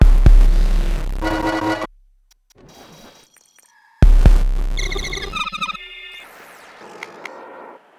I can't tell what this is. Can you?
Music > Multiple instruments
Industrial Estate 23
120bpm, Ableton, chaos, industrial, loop, soundtrack, techno